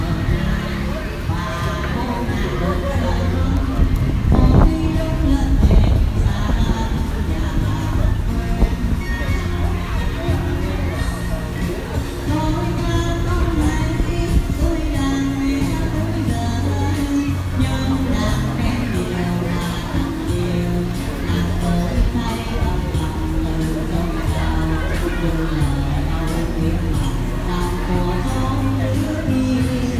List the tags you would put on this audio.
Soundscapes > Urban
Thn
Dng
local
Hin
Nguyn
ambient
music
Vietnam
musicians
street
urban

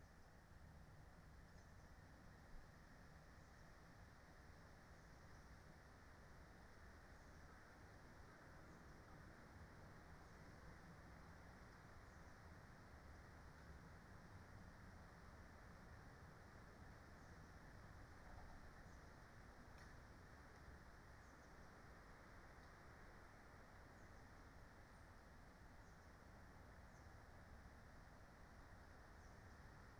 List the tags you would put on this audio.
Soundscapes > Nature
nature
field-recording
artistic-intervention
phenological-recording
weather-data
modified-soundscape
raspberry-pi
data-to-sound
soundscape
Dendrophone
natural-soundscape
sound-installation
alice-holt-forest

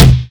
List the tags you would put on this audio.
Instrument samples > Percussion
16x16-inches
timpano
drum
metal
16x16-inch
Majestic
unsnared
Pearl
bubinga
floortom-1
pop
DW
tom
thrash
floor
floortom
heavy
drumset
Ludwig
thrash-metal
heavy-metal
death-metal
sound-engineering
Tama-Star
tom-tom
rock
death
sapele
Tama

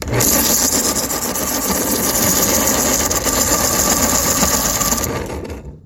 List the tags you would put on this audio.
Sound effects > Objects / House appliances
pencil
sharpener

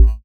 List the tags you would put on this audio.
Instrument samples > Synths / Electronic
additive-synthesis
bass

